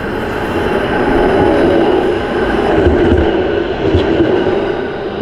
Sound effects > Vehicles
Tram approaching the recording device. Recorded during the winter in an urban environment. Recorded at Tampere, Hervanta. The recording was done using the Rode VideoMic.